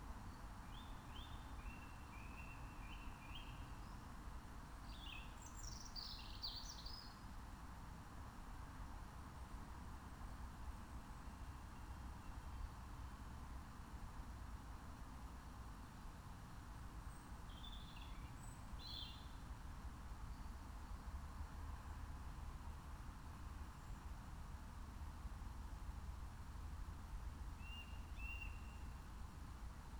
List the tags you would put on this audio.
Soundscapes > Nature
meadow alice-holt-forest raspberry-pi field-recording nature natural-soundscape phenological-recording soundscape

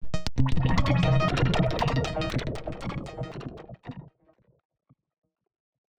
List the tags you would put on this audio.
Sound effects > Electronic / Design
Alien Analog Crazy Experimental IDM Impulse Noise Otherworldly Robotic SFX Synth Tone

